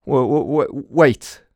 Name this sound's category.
Speech > Solo speech